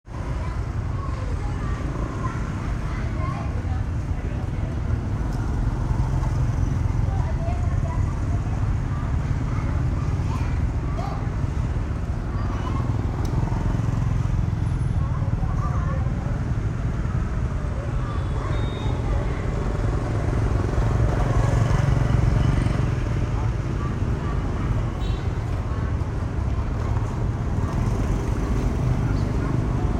Soundscapes > Urban
Giao Thông Tại Góc Chợ Châu Thành - Market Trafic - Trafico De Mercado
Trafic sound in Chợ Châu Thành. Record use iPhone 7 Plus smart phone 2025.11.20 16:56
motorcycle, trafico, motor, trafic, road, car